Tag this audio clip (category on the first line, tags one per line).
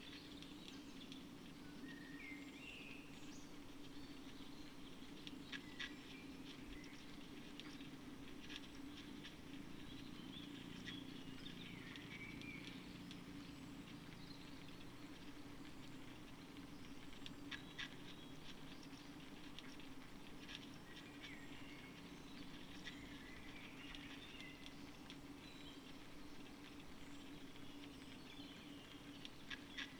Soundscapes > Nature
artistic-intervention
data-to-sound
Dendrophone
modified-soundscape
natural-soundscape
phenological-recording
raspberry-pi
soundscape